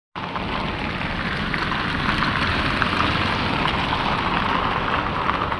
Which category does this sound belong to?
Sound effects > Vehicles